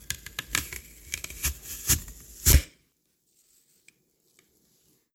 Sound effects > Objects / House appliances
FOODCook-Samsung Galaxy Smartphone, CU Apple Slicer, Slice Apples Nicholas Judy TDC
An apple slicer slicing an apples.